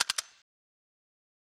Sound effects > Other mechanisms, engines, machines
Ratchet strap-7
clicking, crank, machine, machinery, mechanical, ratchet, strap